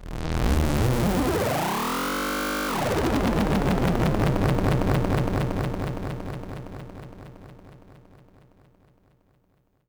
Sound effects > Experimental
Analog Bass, Sweeps, and FX-062
alien, analog, analogue, bass, basses, bassy, complex, dark, effect, electro, electronic, fx, korg, machine, mechanical, oneshot, pad, retro, robot, robotic, sample, sci-fi, scifi, sfx, snythesizer, sweep, synth, trippy, vintage, weird